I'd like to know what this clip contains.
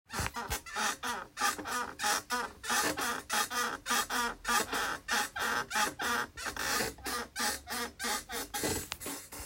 Sound effects > Objects / House appliances
Squeeking Sound of a chair
It's a chair squeeking, but sounds like any other queek of a hinge
comic, chair, squeeking, squeek, comicalsound, fun, mechanic